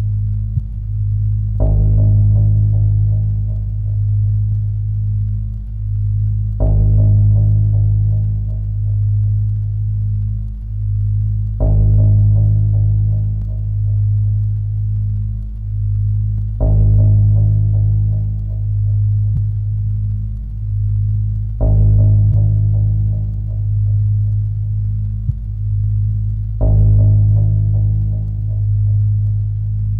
Music > Multiple instruments
Ambient Low Frequency Drum Loop Soundscape Texture #002 at 120bpm
I recorded some samples with my guitar and used Torso S4 to create a rhytmic evolving dark ambient background with low-frequency tones